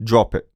Speech > Solo speech
Drop it
Subject : I was looking for a few "Dj chants" / vocals to hype up songs. Frankly I'm not a very good voice actor, not a hype person in general so these get out of my personality, therefore it was challenging and a little cringe as you can hear lol. Weather : Processing : Trimmed and Normalized in Audacity, Faded in/out. Notes : I think there’s a “gate” like effect, which comes directly from the microphone. Things seem to “pop” in. Tips : Check out the pack!
chant, drop, dry, FR-AV2, hype, Male, Man, Mid-20s, Neumann, oneshot, raw, singletake, Single-take, Tascam, U67, un-edited, Vocal, voice